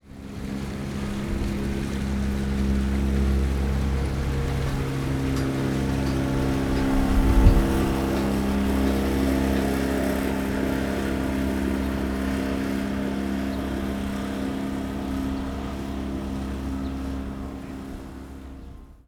Soundscapes > Nature
A recording of a small boat passing by on a canal.
ambience, recording, Field, boat, canal, small